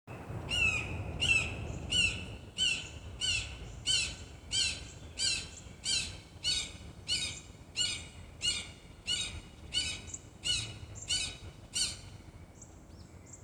Sound effects > Animals
Birds of Prey - Red-shouldered Hawk
Recorded with an LG Stylus 2022, this red-shouldered hawk calls in flight.